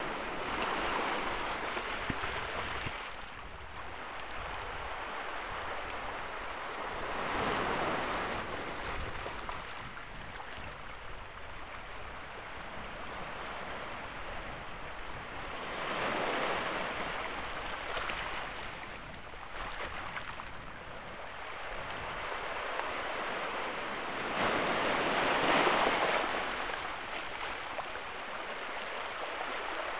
Soundscapes > Nature
Ocean Waves on Beach Rocks
Recorded with the Saramonic SR-Q2 in Placentia, Newfoundland and Labrador, Canada.
royalty, field, ocean, SR-Q2, free, nature, water, wind-sock, recording, field-recording, shore, waves, beach, Saramonic